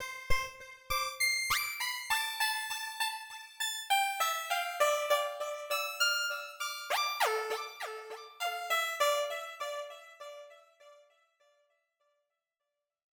Music > Solo instrument
Synthesizer Loop 100bpm 8bars
Made with PoiZone V2 in FL Studio
melodic, hiphop, beat, experimental